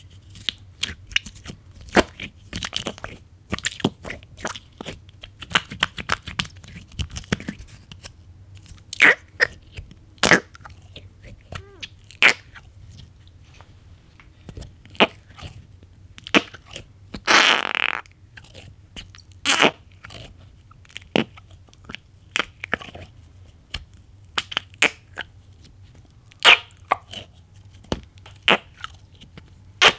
Sound effects > Other
Flarp, Goopy Toy Squishing
Flarp is a goopy toy that you press into and it makes fart-like squishing sounds. I promise i didn't use this for anything untoward Recorded using an external Rode video shotgun mic on a Zoom H1essential recorder
squish, blood, flarp, squishy, jelly, guts, gore, gross, goopy